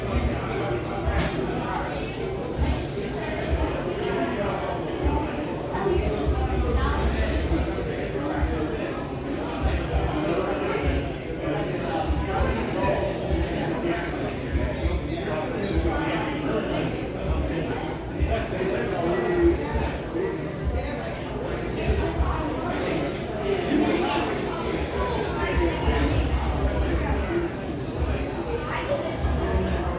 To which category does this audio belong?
Soundscapes > Indoors